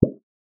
Sound effects > Objects / House appliances
Jewellerybox Open 1 Hit
Opening the lid of a trinket container, recorded with an AKG C414 XLII microphone.